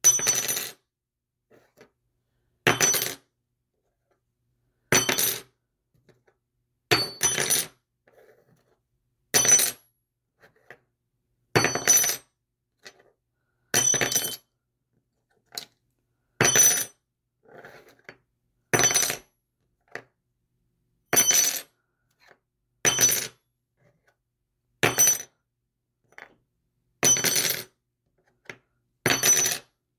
Sound effects > Objects / House appliances
METLImpt-Samsung Galaxy Smartphone, CU Metal Wrench, Drop Nicholas Judy TDC
A metal wrench drop.
drop, foley, metal, Phone-recording, wrench